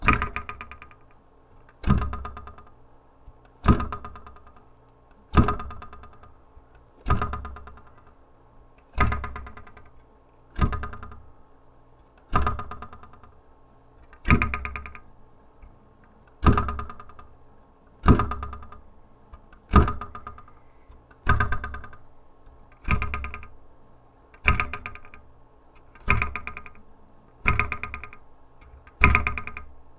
Sound effects > Objects / House appliances
TOONTwang-Blue Snowball Microphone, CU Glass Ruler, Vibrating Nicholas Judy TDC
A glass ruler twanging and vibrating.
twang, glass, ruler, vibrate, Blue-brand, Blue-Snowball, cartoon